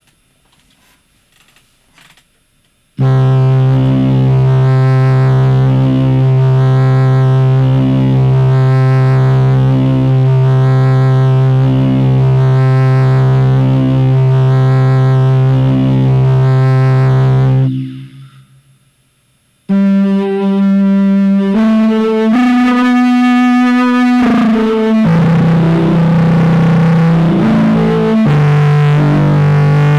Soundscapes > Synthetic / Artificial
A freestyle symphony
bizarre, dreamlike, progressive